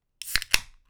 Soundscapes > Other
Sound of opening a beer can. I used a Zoom H1n mounted on a mini tripod stand.